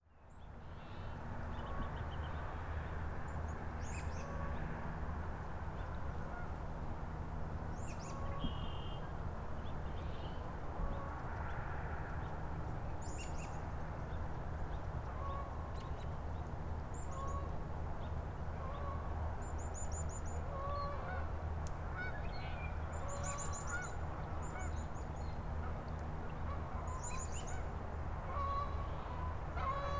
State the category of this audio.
Soundscapes > Nature